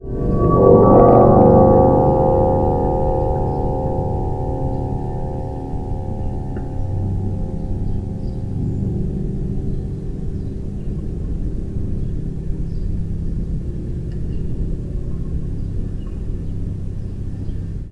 Soundscapes > Nature
Text-AeoBert-Pad-pings-14
Here are a few edits from a long recording (12 hour) of storm Bert November 2024 here in central Scotland. The sounds are both the wind swelling on the harp in addition to the rain hitting the strings of my DIY electric aeolian harp. THis is a selection of short edits that reflect the more interesting audio moments captured.
aeolian, swells, moody, storm, pings, rain